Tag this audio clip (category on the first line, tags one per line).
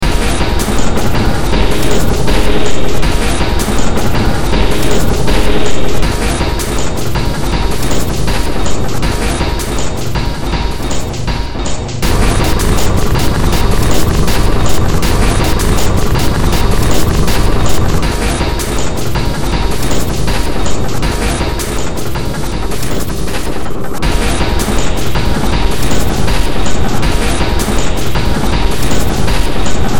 Music > Multiple instruments
Soundtrack
Noise
Sci-fi
Underground
Games
Ambient
Industrial
Cyberpunk
Horror